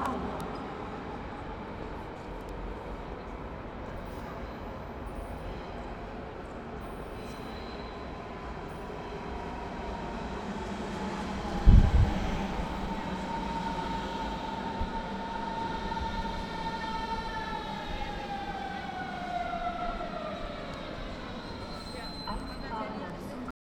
Soundscapes > Urban
Berlin - metro incomming sound
I recorded this while visiting Berlin in 2022 on a Zoom field recorder. Metro sounds.